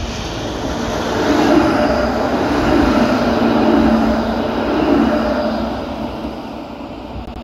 Soundscapes > Urban
ratikka16 copy
traffic, tram, vehicle